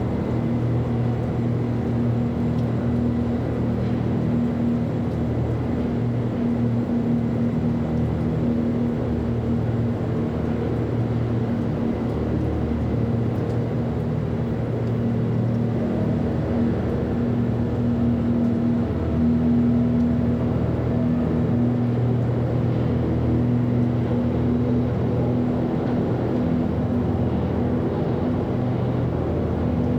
Soundscapes > Synthetic / Artificial
Another looping, experimental ambience created in reaper with various plugins.
ambience, atmosphere, dark, drone, futuristic, industrial, sci-fi, syhnthetic